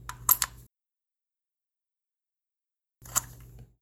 Sound effects > Objects / House appliances
FOLYProp-Samsung Galaxy Smartphone, CU Socket, Plug, Unplug Nicholas Judy TDC
A socket being plugged and unplugged.
Phone-recording, unplug, plug, socket